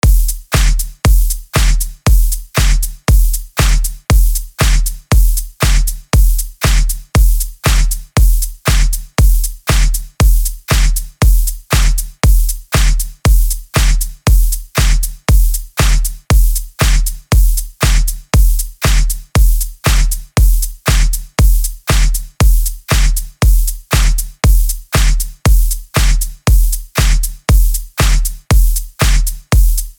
Music > Solo percussion
Ableton Live. VST .Nexus,........Drums 118 Bpm Free Music Slap House Dance EDM Loop Electro Clap Drums Kick Drum Snare Bass Dance Club Psytrance Drumroll Trance Sample .